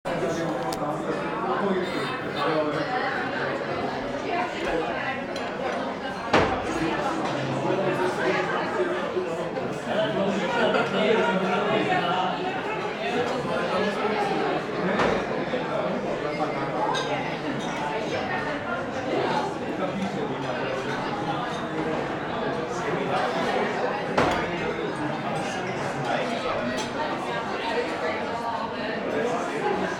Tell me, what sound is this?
Soundscapes > Urban
Prague Restaurant - Pivovar U Medvídků
Prague, Pivovar, people, ambience, crowd, plates, czech, Medvidku, Restaurant